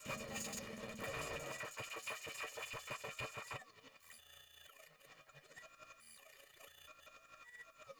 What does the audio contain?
Sound effects > Electronic / Design
Christmas Wrapping Paper
I finally sat down to explore Native Instruments Absynth sampler feature. I used samples from my, 'Broken Freezer Sample Pack' samples to make these noises. It is a low effort beginner pack. It is for documentation purposes but maybe you can find it useful.
abstract, Christmas-themed, sound-design, absynth